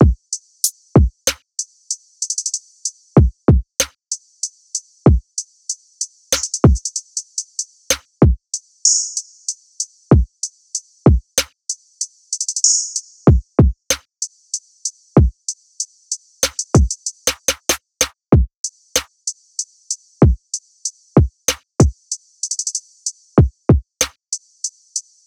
Instrument samples > Percussion
Trap Sample Packs